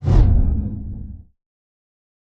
Sound effects > Other
Sound Design Elements Whoosh SFX 044
transition, trailer, dynamic, sound, effect, element, production, sweeping, fx, elements, ambient, swoosh, movement, fast, whoosh, effects, design, audio, cinematic, motion, film